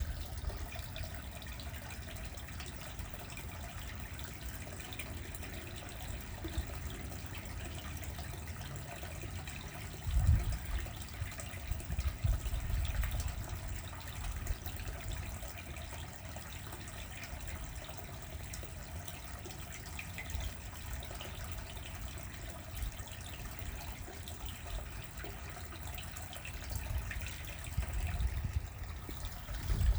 Sound effects > Natural elements and explosions
A recording of water in a drain. Very nice burbling sound.